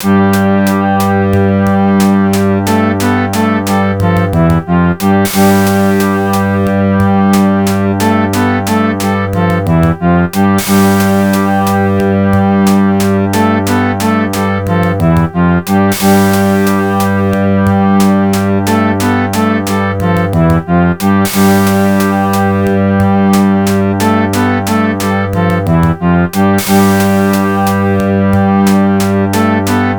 Music > Multiple instruments
Orchestra Loop
Orchestral track (5 seconds loop) Edit: to clarify, this is an Furnace Tracker Short loop. Also no, this is not stolen, but if it sounds familiar, maybe show the loop to see #0:05 Crash cimbal begins
Loop, FM, Orchestra